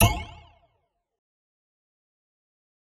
Sound effects > Experimental
Zero-G Racquet Hit 3
A failed attempt to make some other material led to the creation of these satisfying impact sounds. I imagined a blisteringly fast, zero-gravity sports game where athletes wield electric racquets/bats and hit floating spheres. (Or something else if you prefer.) Fun fact: The samples I put various through filters here were recordings of me playing a tiny kalimba.